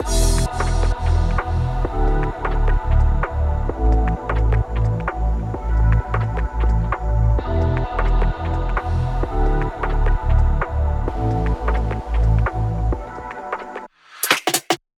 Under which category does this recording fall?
Music > Multiple instruments